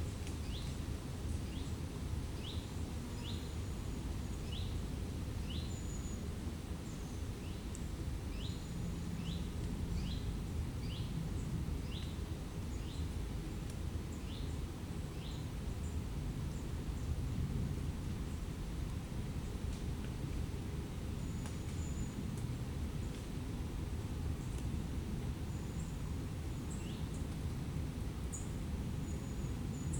Soundscapes > Nature
20250910 14h10 Gergueil forret West Q5
Subject : Ambience recording of the western forest in Gergueil. Date YMD : 2025 September 10 around 14h10 Location : Gergueil 21410 Bourgogne-Franche-Comte Côte-d'Or France Hardware : Dji Mic 3 internal recording. Weather : Processing : Trimmed and normalised in Audacity. Notes : Left the mic under a steep rocky formation going down the "combe".